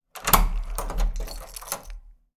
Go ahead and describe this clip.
Sound effects > Objects / House appliances
Key lock
Metallic sound of a key turning in a lock. This is a demo from the full "Apartment Foley Sound Pack Vol. 1", which contains 60 core sounds and over 300 variations. Perfect for any project genre.
lock, mechanism, key, security, metallic